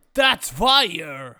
Speech > Solo speech
thats fire
excited, human, male, man, voice, yelling